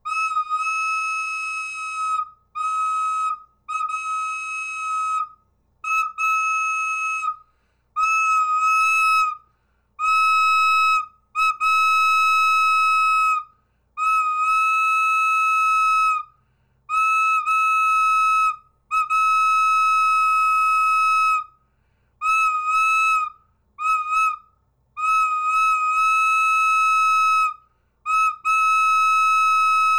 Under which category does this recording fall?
Music > Solo instrument